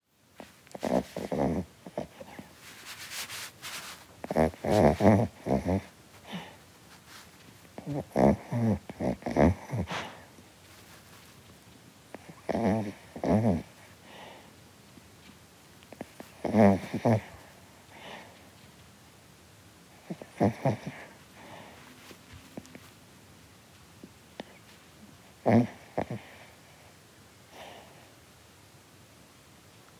Sound effects > Animals
Senior Chihuahua Dreaming and Snoring
My senior chihuahua dreaming, snoring and twitching on the sofa. Closely recorded.